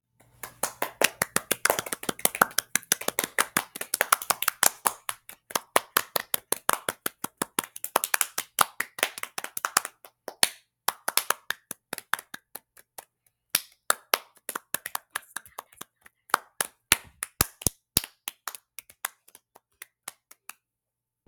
Sound effects > Human sounds and actions
Clapping (2 people) Original
clapping-sounds, clappingsounds, clapping, claps, clap, hand-clapping, applause